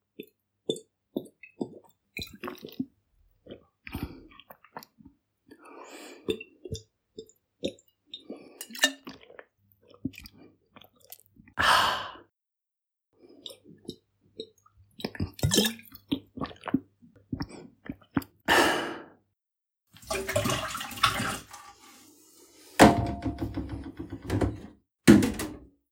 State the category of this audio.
Sound effects > Human sounds and actions